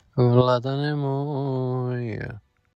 Speech > Solo speech
A sample of me calling my boyfriend
personal, sample, sound